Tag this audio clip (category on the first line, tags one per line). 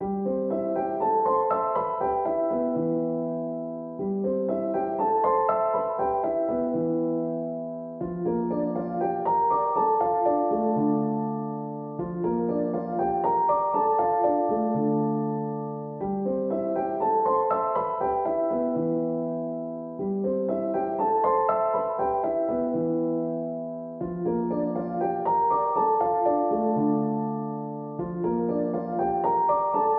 Music > Solo instrument
music
pianomusic
simple
samples
120
loop
120bpm
simplesamples
piano
free
reverb